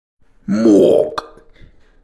Speech > Solo speech
Man shouting name morc. Recorded on own Google Pixel 6
Shout, Person, People